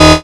Instrument samples > Synths / Electronic
DRILLBASS 4 Eb
additive-synthesis, fm-synthesis, bass